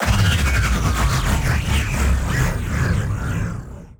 Sound effects > Electronic / Design
A synthetic designed impact hit created in Reaper with various plugins.
synthetic, game-audio, electronic, evolving, sound-design, sfx, hit, Impact, processed